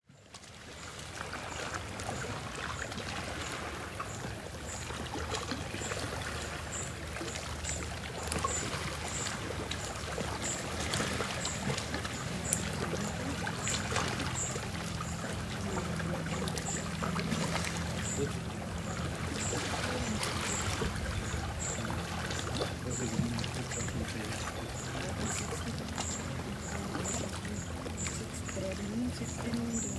Soundscapes > Nature
Corfu - Southern Beach - Waves Lapping, Boats Engines, Birds, Distant People
Field-recording made in Corfu on an iPhone SE in the summer of 2025.
boats, corfu, lapping, nature, seaside, waves